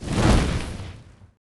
Sound effects > Objects / House appliances
This can be used as flapping wings effect or just bed sheet sound. I made them using my phone when I swing my bed sheet.
bed, wings